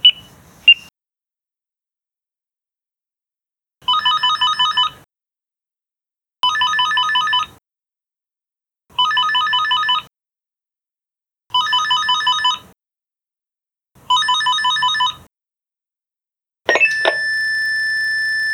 Sound effects > Objects / House appliances
building security dial
NOTE: A higher quality recording is available! The type where a guest comes to the building entrance, punches in a resident's flat number and that flat's resident answers to open the main building door. Recorded with my phone somewhere in january 2024, then converted to use in a project until i just found it again (yes this is fully my sound effect, i recorded it). #0:00 puching in the flat number #0:04 dialing #0:16 flat resident answering the intercom, long beep
apartments, beep, boop, button, click, dial, intercom